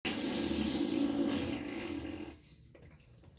Sound effects > Human sounds and actions
Nasty Fart
Very passionate Fart
Fart, Hot, Sexy